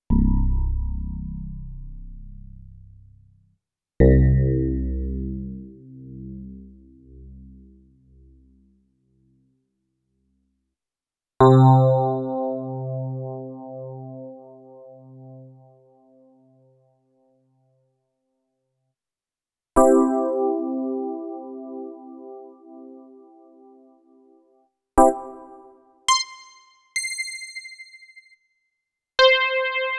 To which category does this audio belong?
Instrument samples > Piano / Keyboard instruments